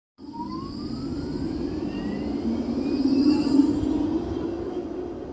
Soundscapes > Urban
final tram 30
tram, hervanta, finland